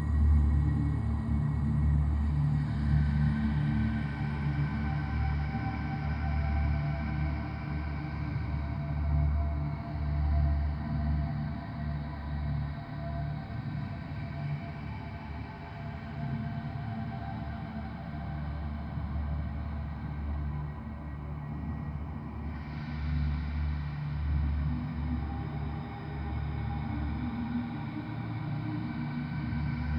Soundscapes > Synthetic / Artificial
Horror Atmosphere 13 Feverdream Loop
Horror-Ambience, Nightmare, Horror-Atmosphere, Dark-Ambience, Horror, Hallucinating, Dark-Atmosphere, Feverdream, Seamless-Loop, Evil-Spirits